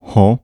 Speech > Solo speech
Subject : Mid 20s male, saying "Huh" in confusion. Date YMD : 2025 June 14 Location : Albi 81000 Tarn Occitanie France. Hardware : Tascam FR-AV2, Shure SM57 with A2WS windcover Weather : Processing : Trimmed in Audacity.
Sm57, hun, one-shot, FRAV2, mid-20s, A2WS, male, FR-AV2, 20s, huh, human, Tascam, vocal